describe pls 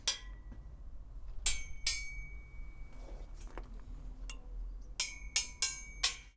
Sound effects > Objects / House appliances
Junkyard Foley and FX Percs (Metal, Clanks, Scrapes, Bangs, Scrap, and Machines) 132
Clang, Dump, dumping, Machine, Metal, Metallic, rubbish, scrape